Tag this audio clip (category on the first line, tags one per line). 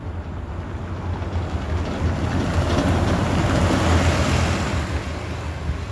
Sound effects > Vehicles
vehicle
transportation
bus